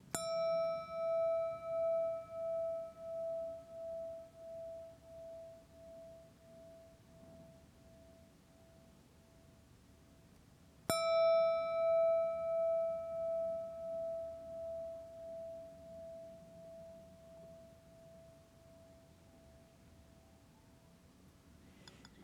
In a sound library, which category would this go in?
Sound effects > Objects / House appliances